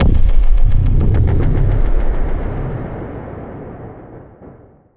Instrument samples > Synths / Electronic
CVLT BASS 62
bass, bassdrop, clear, drops, lfo, low, lowend, stabs, sub, subbass, subs, subwoofer, synth, synthbass, wavetable, wobble